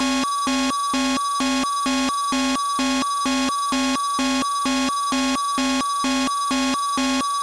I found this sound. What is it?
Sound effects > Electronic / Design
A designed alarm SFX created using Phaseplant VST.